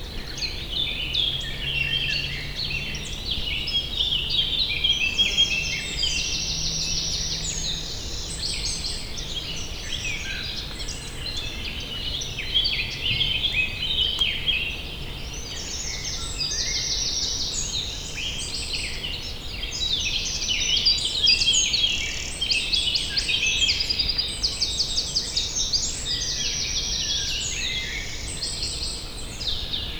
Soundscapes > Nature
250418 10h28 Gergueil West ORTF
Subject : Forest ambience west of Gergueil. Date YMD : 2025 04 18 near 10h28 Location : 47.2381430731398, 4.801396961323667 , Gergueil 21410, Côte-d'Or, Bourgogne-Franche-Comté. Weather : Half grey, half clear sky 11°C ish, small breeze. Processing : Trimmed and Normalized in Audacity. Notes : I was behind the mic when recording. May hear a tad human noise here and there.
FR-AV2, Forrest, field-recording, Bourgogne-Franche-Comte, April, Environment, Birds, NT5, Gergueil, Rode-NT5, Nature, 21410, Forest, Bird, ORTF, Tascam, country-side, 2025, France, Cote-dor, windless